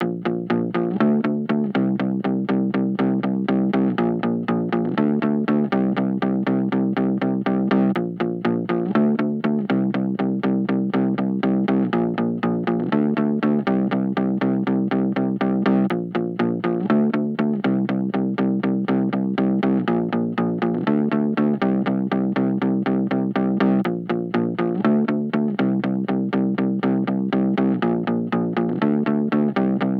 Music > Solo instrument
Elilectric guitar distorded . The VST plugin cybercore drive was used for the fuzz effect This sound can be combined with other sounds in the pack. Otherwise, it is well usable up to 4/4 60.4 bpm.